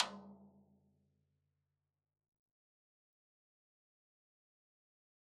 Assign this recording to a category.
Music > Solo percussion